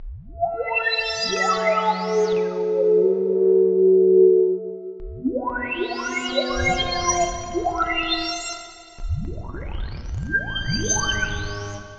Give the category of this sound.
Soundscapes > Synthetic / Artificial